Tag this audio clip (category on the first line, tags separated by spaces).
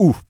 Speech > Solo speech
2025 Adult Calm FR-AV2 Generic-lines hurt Hypercardioid july Male mid-20s MKE-600 MKE600 oof pain Sennheiser Shotgun-mic Shotgun-microphone Single-mic-mono Tascam VA Voice-acting